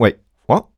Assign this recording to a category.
Speech > Solo speech